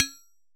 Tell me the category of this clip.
Sound effects > Objects / House appliances